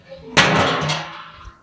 Sound effects > Objects / House appliances
Metal bucket sliding and colliding with something. Recorded with my phone.
collide, impact, metal, slide